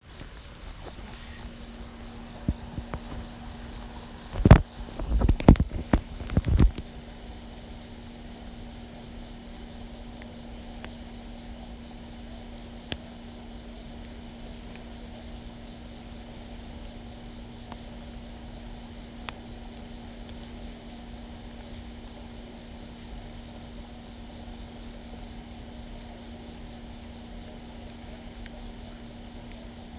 Soundscapes > Nature
Night summer Bugs in Ansan-si. South Korea.
Night and rain. Bugs and water drops. Ansan-si. South Korea.